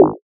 Instrument samples > Synths / Electronic
BWOW 4 Ab
additive-synthesis, bass, fm-synthesis